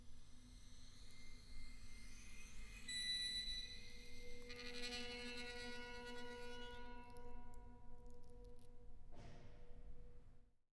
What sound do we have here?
Sound effects > Other
Bowing metal stairs with cello bow 2
Bowing the metal part of the staircase in our apartment building. It's very resonant and creepy.
atmospheric, bow, effect, scary, eerie, metal, horror, fx